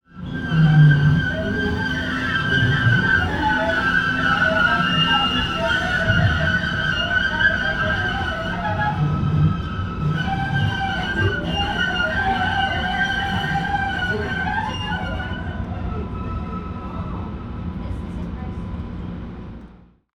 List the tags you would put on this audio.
Soundscapes > Urban
screeching MBTA

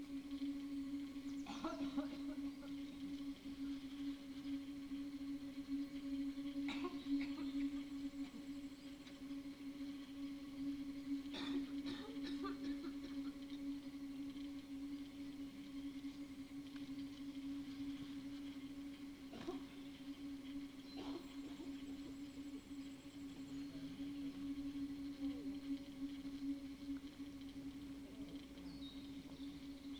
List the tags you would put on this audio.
Soundscapes > Nature
sound-installation Dendrophone nature data-to-sound raspberry-pi alice-holt-forest weather-data phenological-recording soundscape field-recording natural-soundscape modified-soundscape

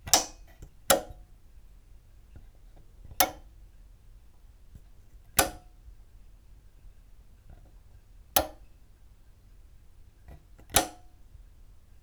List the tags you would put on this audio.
Sound effects > Other mechanisms, engines, machines
RAW Edited-and-raw Shotgun-microphone Shotgun-mic Tascam MKE-600 switch light old-school FR-AV2 edited click retro Sennheiser Single-mic-mono MKE600 flip Hypercardioid

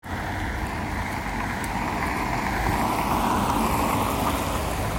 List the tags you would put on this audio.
Sound effects > Vehicles
auto city field-recording traffic

